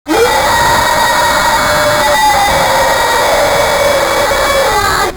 Sound effects > Other
Distorted Scream
Horror, Scream